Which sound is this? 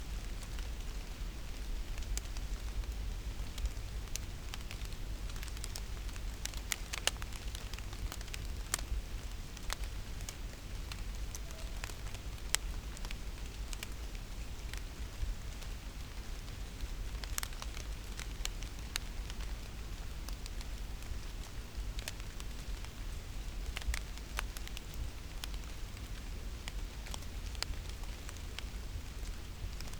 Sound effects > Natural elements and explosions
rain light hitting leaves 2
Rain lightly hitting leaves sounding also like a fire
fire, leaves, rain